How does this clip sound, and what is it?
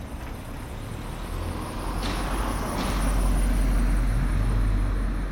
Sound effects > Vehicles
Car 2025-10-27 klo 20.13.02
Sound recording of a car passing by and driving over a manhole cover. Recording done next to Hervannan valtaväylä, Hervanta, Finland. Sound recorded with OnePlus 13 phone. Sound was recorded to be used as data for a binary sound classifier (classifying between a tram and a car).
Car, Field-recording, Finland